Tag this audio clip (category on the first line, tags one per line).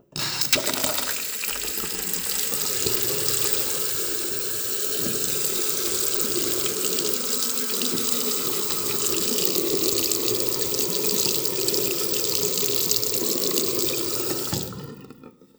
Sound effects > Objects / House appliances
effect
h2o
sink